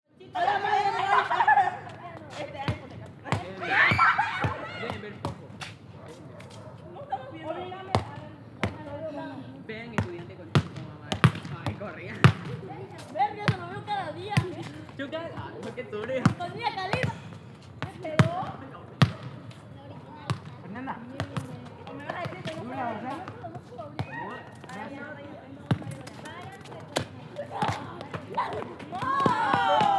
Soundscapes > Urban
Jovenes juegan al basket en cancha Bolivia
Young people chat and play football and basketball in a court at night in Santa Cruz de la Sierra, Bolivia.
de, Cruz, Basketball, South, Court, la, Santa, Sierra, Youngsters, football, field, Bolivia, America, recording